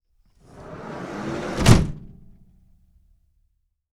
Sound effects > Vehicles
Ford 115 T350 - Side door sliding shut (from interrior)
Subject : Recording a Ford Transit 115 T350 from 2003, a Diesel model. Date YMD : 2025 August 08 Around 19h30 Location : Albi 81000 Tarn Occitanie France. Weather : Sunny, hot and a bit windy Processing : Trimmed and normalised in Audacity. Notes : Thanks to OMAT for helping me to record and their time.
T350, 2003-model, SM57, August, 2003, FR-AV2, Single-mic-mono, 115, Tascam, Ford, Van, Mono, Old, A2WS, France, 2025, Vehicle, Ford-Transit